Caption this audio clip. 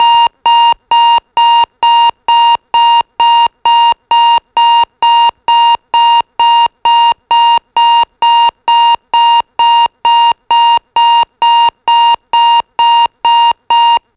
Sound effects > Objects / House appliances
884hz tone Lo-Fi from speaker
Intending to re-do a digital alarm clock sound, I generated a 884 hz square wave on Audacity and sampled it though my Pocket Operator PO-33 to crunchy lo-fi it. I then recorded it from the loudspeaker using a zoom h2n's Mono mic mode. Made and recorded on the 2025 05 12. Using a PO-33 and Zoom H2n. Trimmed and Normalized in Audacity.
Tone, Alarmclock, square-wave, speaker, Po-33, unpure, Alarm-clock, A, 884hz, Alarm, A-note